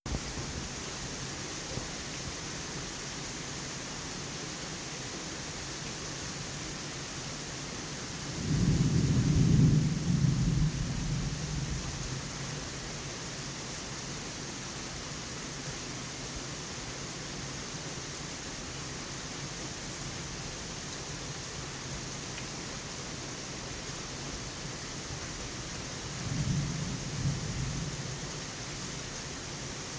Soundscapes > Nature
A six minute rainshower and thunder booming and rumbling soundtrack.